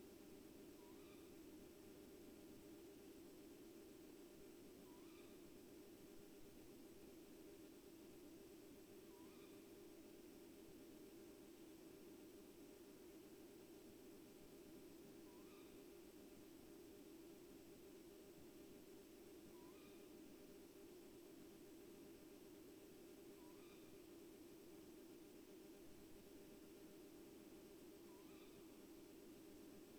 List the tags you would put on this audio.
Soundscapes > Nature
field-recording Dendrophone natural-soundscape data-to-sound modified-soundscape alice-holt-forest artistic-intervention soundscape sound-installation phenological-recording raspberry-pi weather-data nature

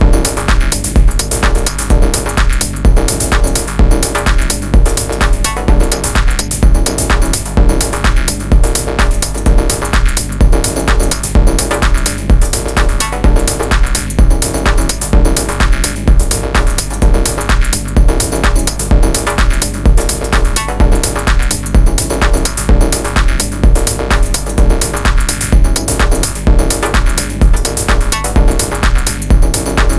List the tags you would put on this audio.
Music > Multiple instruments
compressed loop guitar spaced electronic reverb 127 techno

